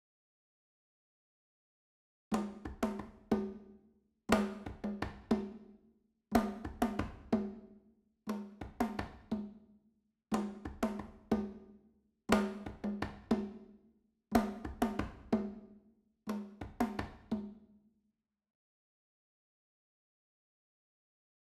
Instrument samples > Percussion
Bambuco 120BPM
BAMBUCO, Percussion, rythm